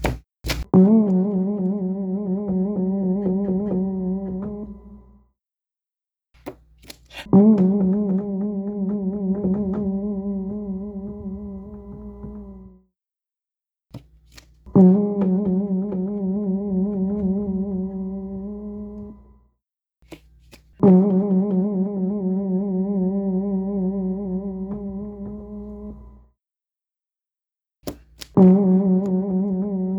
Sound effects > Other
A comical bow and arrow sequence.